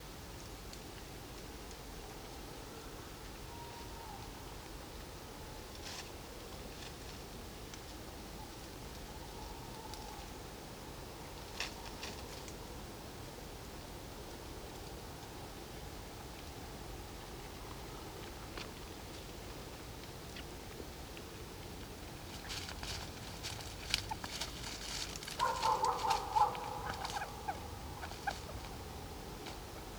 Soundscapes > Nature
Night-time Sounds
Sounds that can be heard are: tawny owl, red fox and dog, all in the distance. There are some sounds of an animal moving and making a squeaking sound that are closer. Though I am not certain, I think these may be a rabbit. There are many wild rabbits in the area. This was an unattended recording with the Zoom H1e and Earsight stereo pair of mics being left overnight in a hawthorn tree. The time is approximately 01:00 in the morning. Recorded in East Sussex, UK.
nature, owl, night, night-time, field-recording, nighttime, fox